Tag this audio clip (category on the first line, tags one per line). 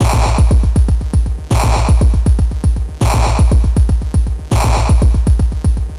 Music > Solo percussion
Drums
Circuit-Bend
Electronic
Hi-Hats
Clap
Snare
Drum
Bleep
Kick
Loop
Lo-Fi
Analog